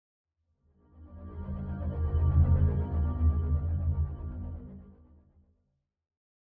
Sound effects > Experimental
Flying Car Fly By 1.5
Electric Eraser combined with an oscillating synth to create the sound of a futuristic car flying by